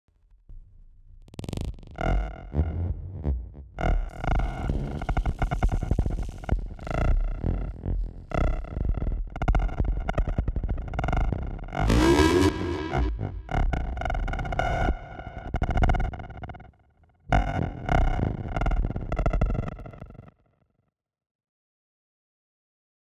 Sound effects > Electronic / Design
Optical Theremin 6 Osc Shaper Infiltrated-026
IDM, Machine, Otherworldly, Robot, EDM, SFX, Synth, Weird, Robotic, Alien, Gliltch, Electronic, DIY, Noise, Impulse, Experimental, Saw, Chaotic, FX, Pulse, Tone, Mechanical, strange, Loopable, Crazy, Theremin, Analog, Oscillator, Electro